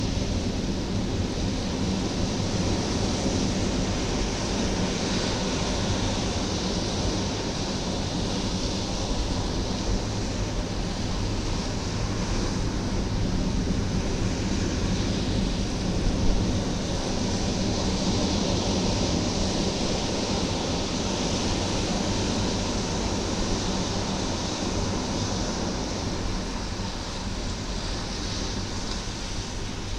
Soundscapes > Nature
Sounds of the ocean taken from inside of a small cave, loud waves rushing and also slowing down at times.